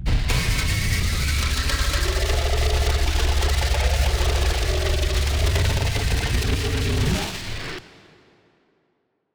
Sound effects > Experimental
Creature Monster Alien Vocal FX-26
Deep, Echo, Snarling, Creature, scary, Groan, Snarl, evil, Sound, gutteral, Alien, visceral, Vocal, demon, Sounddesign, Reverberating, Otherworldly, Ominous, Vox, gamedesign, boss, Animal